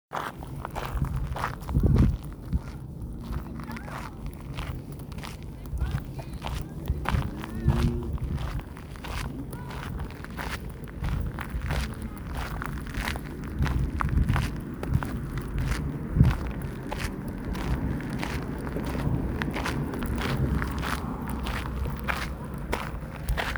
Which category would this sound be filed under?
Soundscapes > Nature